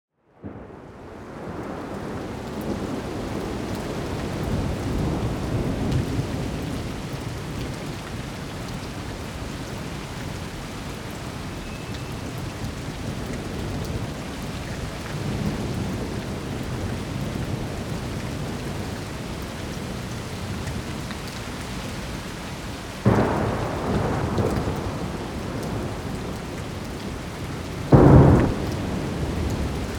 Soundscapes > Nature
Rain and thunder
This was from a storm on 4-24-25 located in the midwest of the USA.
Rain, Thunder, Thunderstorm, Weather, Wind